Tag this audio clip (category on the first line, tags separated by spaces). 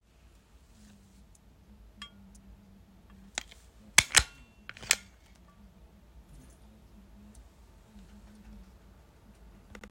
Sound effects > Human sounds and actions
homework office stanpler staple work